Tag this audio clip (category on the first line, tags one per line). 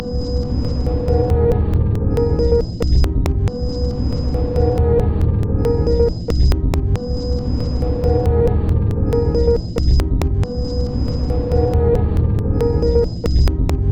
Instrument samples > Percussion
Samples
Loop
Drum
Weird
Alien
Loopable
Packs
Underground
Soundtrack
Ambient
Industrial
Dark